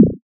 Instrument samples > Synths / Electronic
BWOW 4 Bb
additive-synthesis, bass, fm-synthesis